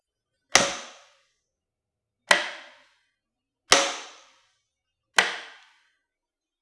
Sound effects > Objects / House appliances
Light Switch Flicking On and Off
A fairly clunky industrial light switch being flicked on and off. Perhaps someone has just woken up, or has just entered a dusty storage room, or maybe someone flicks the lights on and off to annoy a sibling. It's completely up to you! Enjoy! Recorded on Zoom H6 and Rode Audio Technica Shotgun Mic.
click, electrical, flip, industrial, light, lights, lightswitch, switch, switching